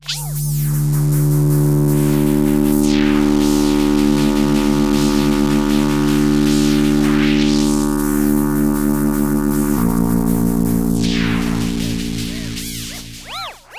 Sound effects > Experimental
a strange and cool drone sfx i creatd using fl studio and a myriad of vsts.
abstract, Ambient, Drone, effect, electric, electronic, experimental, freaky, future, fx, glitch, glitchy, lo-fi, loop, loopable, noise, pad, sci-fi, scifi, sfx, sound-design, sounddesign, soundeffect, strange, weird, wtf